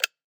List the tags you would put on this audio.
Sound effects > Human sounds and actions
switch
interface
activation
off
click
button
toggle

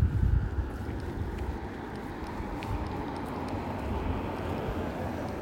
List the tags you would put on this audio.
Soundscapes > Urban
tampere
vehicle
car

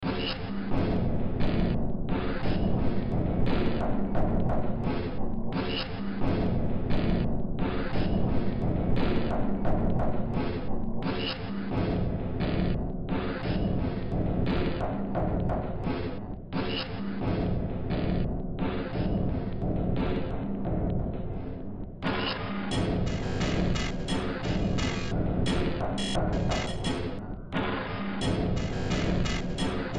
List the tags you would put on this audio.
Music > Multiple instruments
Games
Cyberpunk
Horror
Sci-fi
Ambient
Soundtrack
Industrial
Underground
Noise

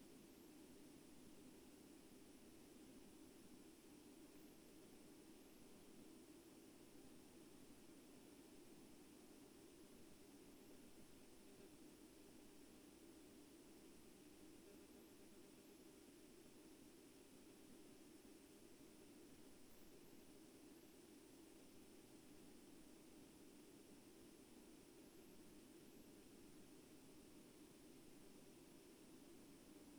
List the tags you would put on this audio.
Soundscapes > Nature
nature
artistic-intervention
phenological-recording
field-recording
modified-soundscape
natural-soundscape
alice-holt-forest
raspberry-pi
data-to-sound
soundscape
Dendrophone
sound-installation
weather-data

